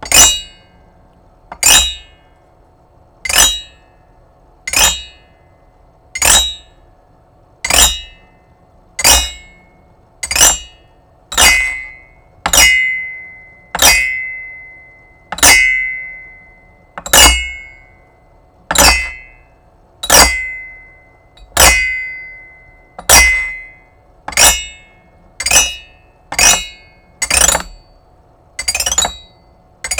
Music > Solo percussion
MUSCTnprc-Blue Snowball Microphone, CU Xylophone, Toy, Glisses, Various, Comical Tune Nicholas Judy TDC
Various toy xylophone glisses, then comical tune at end.
comical, gliss